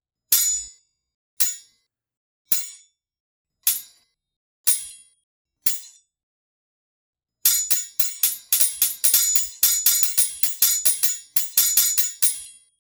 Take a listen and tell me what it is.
Sound effects > Objects / House appliances
Sword hits sounds inspired fate heaven feel 03 FINAL
sounds of small katana blades inspired by ufotable heaven feel and demon slayer infinity castle. Can be used for characters wielding katanas, spears, Knives or tessen.
anime, attack, battle, blade, clash, combat, demonslayer, duel, fight, fighting, hit, karate, katana, knife, light, martialarts, medieval, melee, metal, metallic, ninjutsu, samurai, sword, swords, ting, weapons